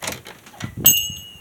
Sound effects > Objects / House appliances
entrance open1
Entrance door being opened with a bell ring. Recorded with my phone.
bell, chime, door, open, opening, ring